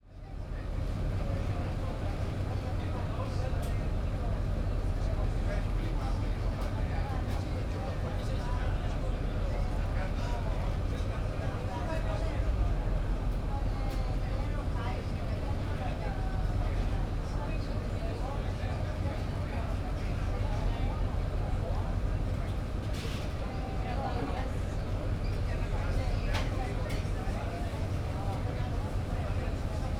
Soundscapes > Indoors
Greek ferry boat third class lounge atmosphere 2m
Third-class lounge atmosphere field recording on a ferry boat in the Mediterranean Sea. Engine drone, as well as some passenger voices, can be heard in this Greek ferry boat.
atmosphere, lounge, boat, sounds